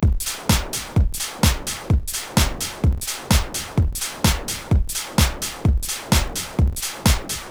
Instrument samples > Percussion
drums 128bpm
Easy 128bpm beat
quantized, drum-loop, breakbeat, dance, drums, 128bpm, drum, loop, beat